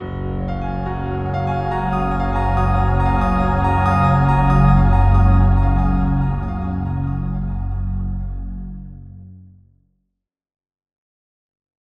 Music > Multiple instruments
Lush Crescendo (Glittering Sea) 1
intense-riser, start-mission, epic-riser, new-game-music, soothing-crescendo, powerful-riser, podcast-intro, outro, soothing-riser, new-game-theme, bright-crescendo, mission-begin, powerful-crescendo, gentle-riser, epic-crescendo, complete-quest, new-game-intro, podcast-outro, start-new-game, mission-start, begin-new-game, begin-mission, musical-swell, intense-swell, beautiful-crescendo, crescendo, intro, riser